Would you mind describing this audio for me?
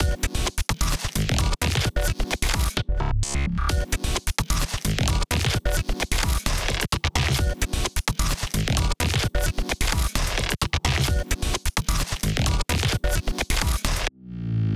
Music > Other
Glitch Loop 01
A designed glitch loop created in Reaper with a bunch of VST's.
digital, electronic, Glitch, loop, synthetic